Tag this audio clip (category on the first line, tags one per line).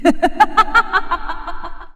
Sound effects > Human sounds and actions
chuckle
eerie
female
giggle
haha
laugh
laughing
laughter
reverb
voice
woman